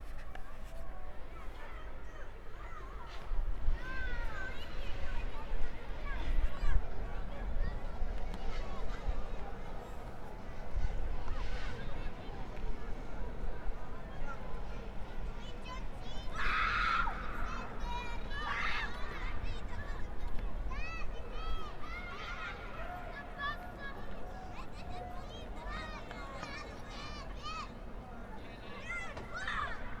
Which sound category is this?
Soundscapes > Urban